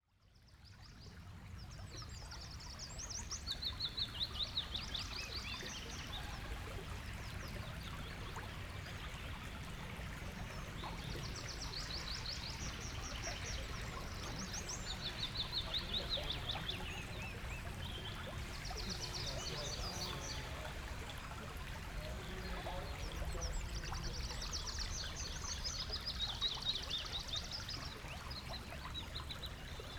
Soundscapes > Nature
An ambience recording at Cannock Chase, Staffordshire. Morning recording with a Zoom F3 and 2 Em272Z1 Omni directional mics.
morning, recording, nature, birds, field, ambience